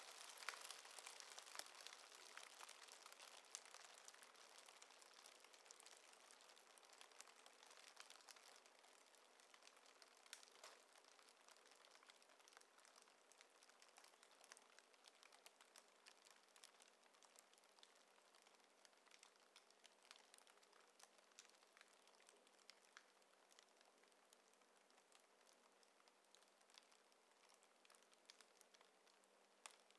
Sound effects > Objects / House appliances
scrunched plastic bag self-unfolding
A bunch of supermarket plastic bags nested into a larger plastic bag. First, these bags were scrunched to a very compact size, and then let were let expand on their own. The resulting sound remembers weak rain or a calm bonfire. Recorded with Zoom H2.
bag, bonfire, plastic, rain, unfolding